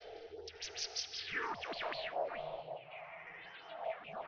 Soundscapes > Synthetic / Artificial
LFO Birdsong 21
birds, massive, lfo